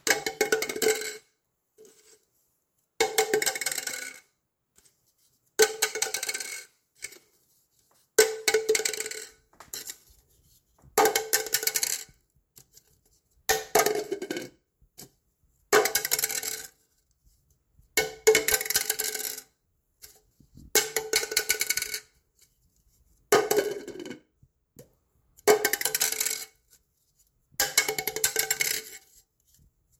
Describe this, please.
Sound effects > Objects / House appliances
A plastic cup dropping.